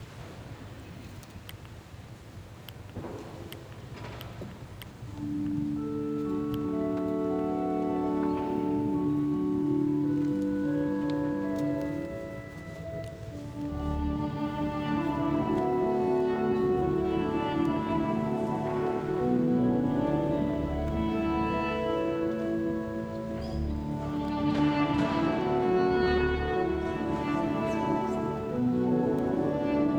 Music > Solo instrument
Duduk Cathedral 04/24/2025 Kennicksburg iphone 13 pro garage band